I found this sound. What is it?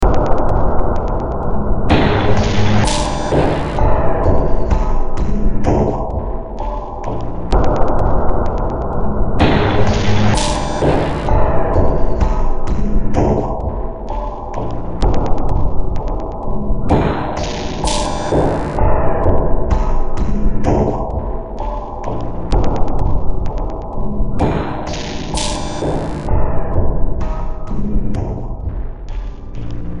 Music > Multiple instruments
Demo Track #3681 (Industraumatic)
Horror, Sci-fi, Ambient, Industrial, Games, Underground, Noise, Cyberpunk, Soundtrack